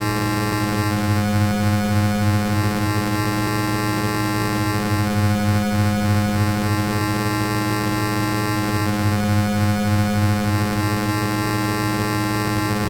Sound effects > Other mechanisms, engines, machines
IDM Atmosphare11 (G# note )
IDM, Working